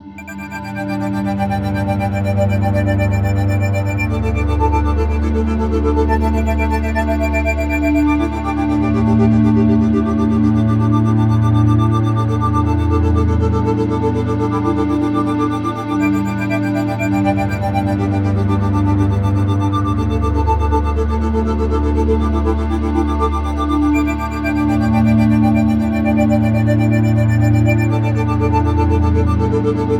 Soundscapes > Synthetic / Artificial
Ambient Flute Based Texture
Ambient background soundscape based on the recording of my kid flute directly on Torso S4 It communicates relax, meditation and at the same time expectation
ambient, background, flute, granular, meditation, meditative, relaxation, relaxing, torso, torso-s4